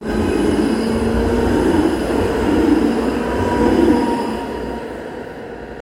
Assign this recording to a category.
Sound effects > Vehicles